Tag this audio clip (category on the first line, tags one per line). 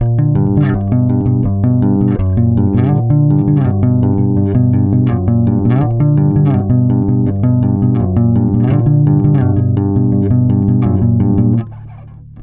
Instrument samples > String
blues
loops
electric
pluck
mellow
fx
slide
oneshots
charvel
loop
bass
riffs
rock
plucked
funk